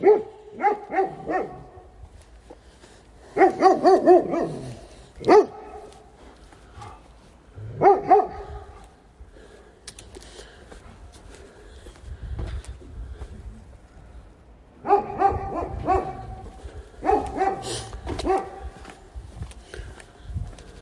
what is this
Sound effects > Animals
Blue black devil dog barking on the street.